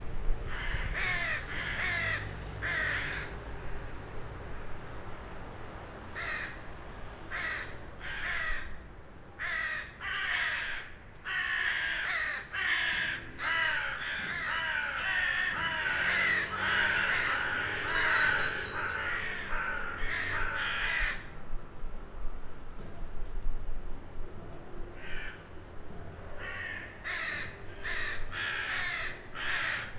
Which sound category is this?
Soundscapes > Nature